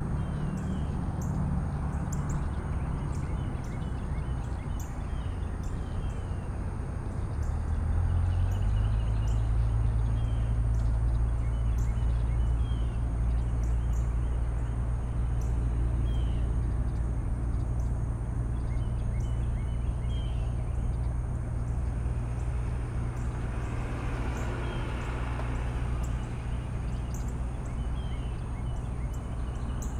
Soundscapes > Urban
AmbSubn-Summer Dawn Commotion, morning commuters, distant bridge traffic, birdsong, crickets QCF Gulf Shores Alabama Sony M10
Dawn along the Gulf Coast, summer, morning commuters, distant bridge traffic, birdsong, crickets.